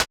Instrument samples > Synths / Electronic
A snare rim one-shot made in Surge XT, using FM synthesis.